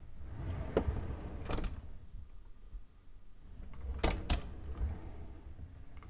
Sound effects > Objects / House appliances
Rolling Drawer 06
drawer, dresser, open